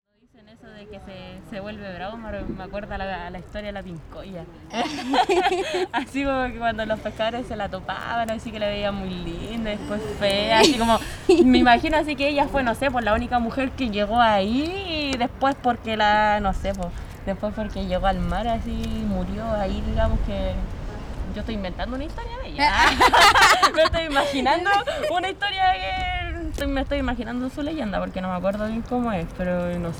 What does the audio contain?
Speech > Solo speech
LA HISTORIA DE LA PINCOYA TERRAPOLIS
Vocal sound of a woman explaining a exert of the Chilean legend of 'Pincoya', a woman coming from the sea. Recorded in Quintero, province of Valparaiso.
legend, Chile, America, pincoya, Vaparaiso, field, South, recording